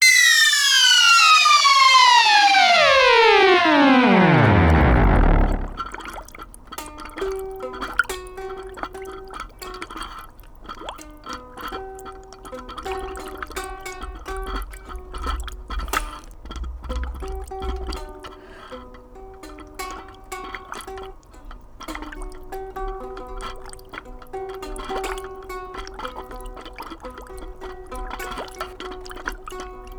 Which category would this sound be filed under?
Sound effects > Electronic / Design